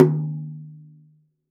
Music > Solo instrument
High Tom Sonor Force 3007-005
drum kit samples recorded in my studio and processed via Reaper
toms, Drumkit, perc, kit, low, Drums, Drum, percussion, oneshot, kick